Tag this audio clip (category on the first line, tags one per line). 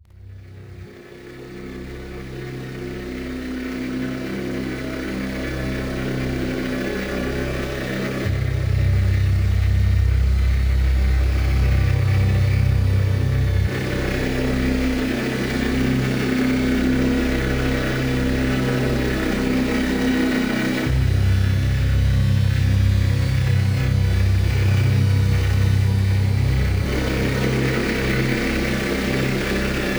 Soundscapes > Synthetic / Artificial
glitch
texture
wind
fx
shimmer
sfx
effect
ambience
evolving
synthetic
ambient
rumble
shifting
alien
bassy
glitchy
howl
roar
low
shimmering
long
experimental
landscape
dark
atmosphere
drone
bass
slow